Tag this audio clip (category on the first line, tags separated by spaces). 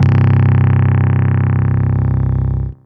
Instrument samples > Synths / Electronic
bass synth lfo clear stabs synthbass subs sub drops subwoofer wobble low bassdrop lowend subbass wavetable